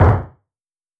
Sound effects > Human sounds and actions
Footstep Gravel Running-03
footstep, gravel, jog, jogging, lofi, run, running, steps, synth